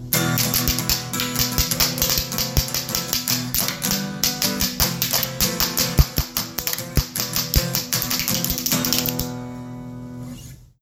Music > Multiple instruments
MUSCPrfm-Samsung Galaxy Smartphone, CU Spanish Music Cue, Guitar, Castanets Nicholas Judy TDC
A spanish music cue with guitar and castanets.